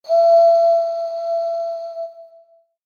Instrument samples > Other
A high note produced by blowing on a bottle with liquid in it. With some reverb effect.
hum, bottle, note, instrumental, blow, experimental, music, wind, high, noise, false, instrument, high-note, traditional, intrumental, calm